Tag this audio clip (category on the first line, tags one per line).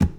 Sound effects > Objects / House appliances
pour
water
metal
object
household
spill
clang
cleaning
garden
kitchen
lid
carry
fill
tip
drop
liquid
debris
tool
handle
hollow
scoop
pail
shake
slam
bucket
knock
clatter
container
plastic
foley